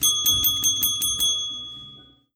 Sound effects > Objects / House appliances
BELLHand-Samsung Galaxy Smartphone, CU Desk, Winning Signal Nicholas Judy TDC
A desk bell winning signal. Recorded at Five Below.
Phone-recording, ding, bell, win, signal, ring, desk